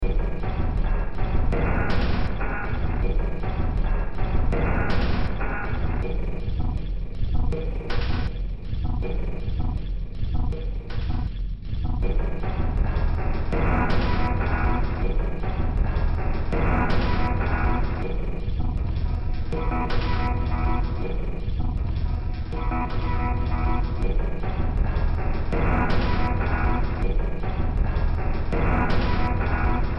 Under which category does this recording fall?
Music > Multiple instruments